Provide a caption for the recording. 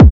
Instrument samples > Percussion
Phonk Kick 3
A kick retouched with ''Attack kick 13'' from FLstudio original sample pack, and tweak some ''Pogo'' amount for it in FLstudio sampler. Processed with waveshaper, ZL EQ, Fruity Limiter.